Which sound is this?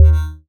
Instrument samples > Synths / Electronic
BUZZBASS 2 Eb
additive-synthesis, bass, fm-synthesis